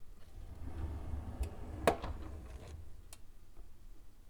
Sound effects > Objects / House appliances
Rolling Drawer 04
open, dresser, drawer